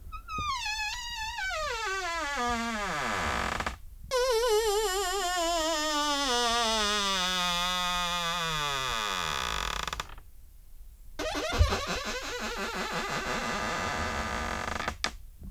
Sound effects > Objects / House appliances
Creaky door
Wooden door creak, recorded with no processing using a Sennheiser Shotgun MKH. could be good for some Halloween fun!